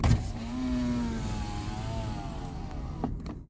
Sound effects > Vehicles
VEHWndw-Samsung Galaxy Smartphone Car, Electric Window, Down Nicholas Judy TDC
An electric car window going down.
car, down, electric, Phone-recording, window